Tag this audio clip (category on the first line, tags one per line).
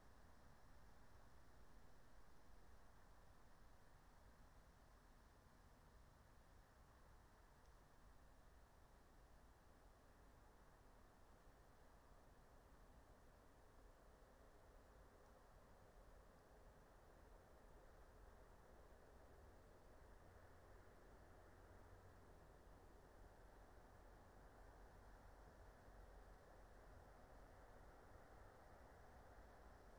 Soundscapes > Nature
field-recording
meadow
phenological-recording
soundscape